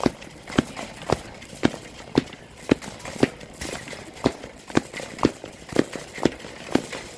Sound effects > Objects / House appliances
OBJWhled-Samsung Galaxy Smartphone, Footsteps Perspective Shopping Cart Wheels Rolling, Looped Nicholas Judy TDC
A shopping cart rolling from a footsteps perspective. Looped. Recorded at Lowe's.
footsteps, Phone-recording, loop, perspective, foley, shopping-cart, roll